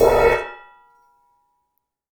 Music > Solo instrument
Sabian 15 inch Custom Crash-11
Cymbals, Sabian